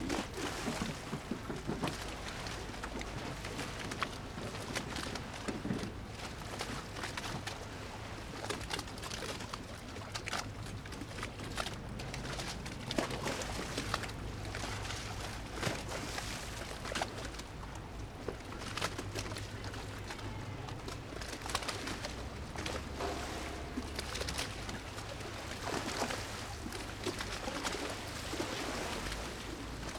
Soundscapes > Urban
Venise Gondola Lapping water
Small waves create splashes against gondolas, on a shore of Venice near San Marco's Square, Venice, Venice, winter 2025 in the morning. The evergoing shuttles make some low rumble in the background. AB omni stereo, recorded with 2 x EM272 Micbooster microphones & Tascam FR-AV2